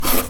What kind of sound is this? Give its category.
Sound effects > Other mechanisms, engines, machines